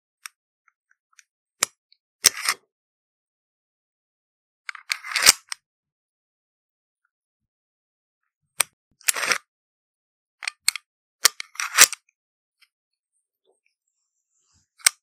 Sound effects > Other mechanisms, engines, machines
Pocket Pistol magazine foley
Magazine reloading of a small pocket pistol. Includes multiple samples in one file